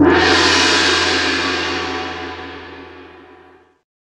Instrument samples > Percussion
gong rock 1
An old big gong. Not the best wavesample but good for jazz music.
bell, Bosporus, brass, bronze, chime, China, copper, crash, cymbal, cymballs, disc, disk, fake, gong, Istanbul, Meinl, metal, metallic, Paiste, percussion, Sabian, steel, tam, tam-tam, tamtam, Zildjian, Zultan